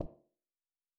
Sound effects > Human sounds and actions
Simple footstep on a soft floor Recorded with a Rode NT1 Microphone